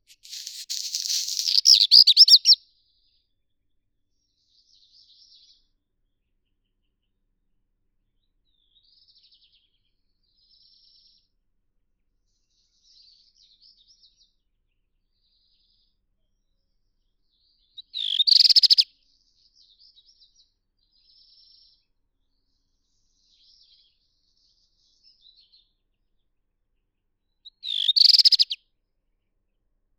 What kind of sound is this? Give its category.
Sound effects > Animals